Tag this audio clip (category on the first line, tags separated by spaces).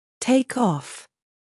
Speech > Solo speech
english pronunciation voice word